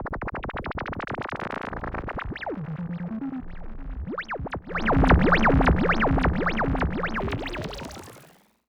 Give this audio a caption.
Sound effects > Experimental
Analog Bass, Sweeps, and FX-068
analogue, synth, scifi, korg, effect, sweep, vintage, oneshot, sample, pad, weird, robotic, retro, robot, bass, analog, mechanical, sfx, complex, bassy, snythesizer, fx, dark, electronic, machine, trippy, alien, sci-fi, electro, basses